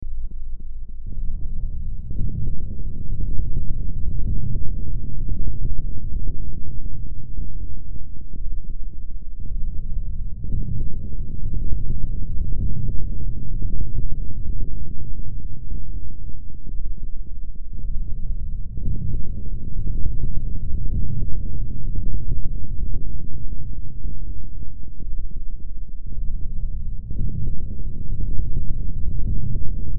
Soundscapes > Synthetic / Artificial
Looppelganger #151 | Dark Ambient Sound
Gothic, Ambience, Underground, Sci-fi, Survival, Horror, Hill, Games, Darkness, Soundtrack, Silent, Ambient, Noise, Drone, Weird